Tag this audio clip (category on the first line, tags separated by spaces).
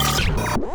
Sound effects > Electronic / Design
digital glitch hard one-shot pitched stutter